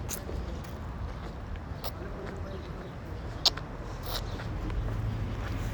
Sound effects > Human sounds and actions
HMNKiss kissing human DOI FCS2
kiss, kissing, human